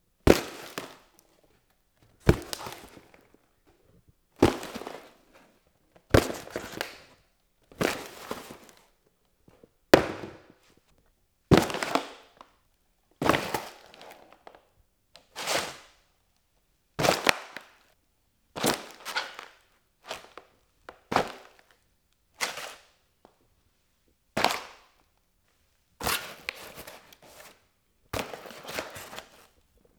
Sound effects > Objects / House appliances
Medium Cardboard Impacts
Various sounds made from cardboard.
box, cardboard, material, paper, rustle, scrape